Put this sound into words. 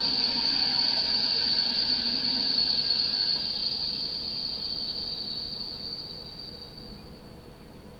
Sound effects > Vehicles
Train squeal
Train screeching past. Screeeee!
transportation, train, vehicle, engine, noise